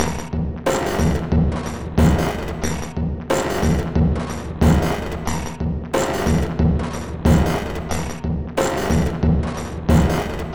Instrument samples > Percussion
This 182bpm Drum Loop is good for composing Industrial/Electronic/Ambient songs or using as soundtrack to a sci-fi/suspense/horror indie game or short film.
Alien, Ambient, Dark, Drum, Industrial, Loop, Loopable, Packs, Samples, Soundtrack, Underground, Weird